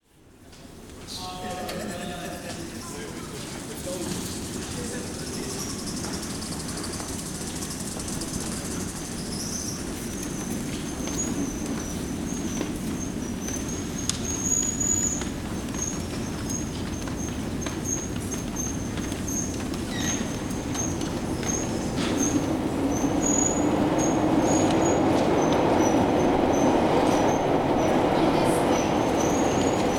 Sound effects > Other mechanisms, engines, machines
Notting Hill Wooden Escalator
Recorded in 1989ish of the Nottinghill wooden escalator for the TFL that now no longer exists. A rare sound indeed to have.